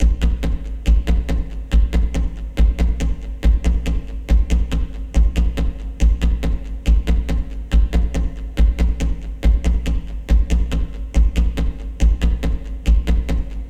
Instrument samples > Percussion
70bpm triplet kick drum thumps with heavy reverb
Rhythmic triplet thuds, heavy kick drum going at 70 bpm. Lots of reverb. Run through ARP 2600 for effects.
heavy, loud, low-end, triple, triplets